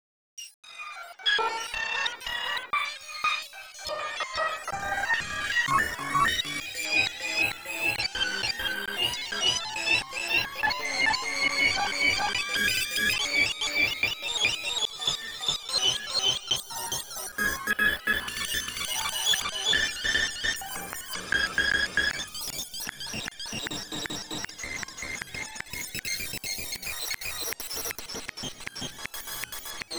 Soundscapes > Synthetic / Artificial
RGS-Random Glitch Sound 12-Glitch Falls
Sample used from ''Phaseplant Factory Samples'' Used multiple Fracture to modulate it. Processed with Khs Phaser, ZL EQ, Fruity Limiter, Vocodex.